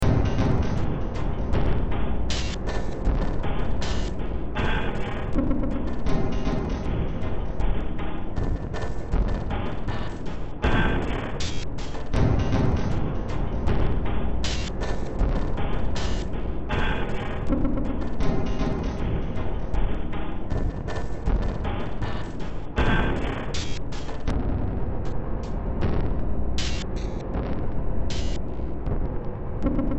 Music > Multiple instruments
Demo Track #3371 (Industraumatic)
Soundtrack, Cyberpunk, Games, Underground, Noise, Ambient, Industrial, Sci-fi